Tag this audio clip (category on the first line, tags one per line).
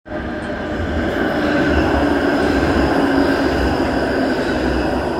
Sound effects > Vehicles
city
public-transport